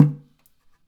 Sound effects > Other mechanisms, engines, machines
metal shop foley -210
rustle, bop, sfx, crackle, wood, tink, pop, metal, little, shop, foley, perc, oneshot, knock, percussion, bam, bang, fx, boom, tools, thud, strike, sound